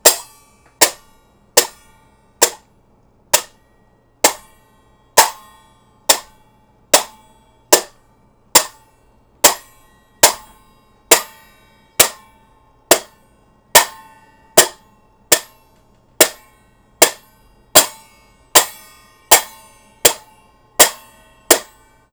Music > Solo percussion
MUSCPerc-Blue Snowball Microphone, CU 6 Inch Hand Cymbals, Crashing Together, Muted Nicholas Judy TDC
6'' hand cymbals crashing together, muted.
together, hand